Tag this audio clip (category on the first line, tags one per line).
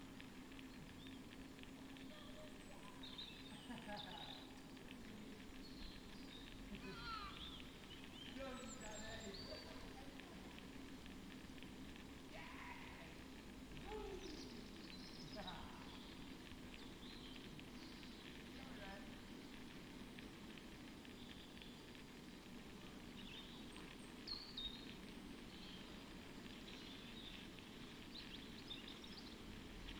Soundscapes > Nature
natural-soundscape
artistic-intervention
field-recording
data-to-sound